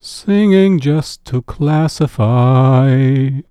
Music > Solo instrument
Singing Voice Test Sample
english, male, singing, voice